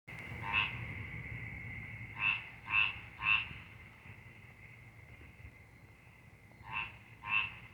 Sound effects > Animals
The croak of a squirrel tree frog.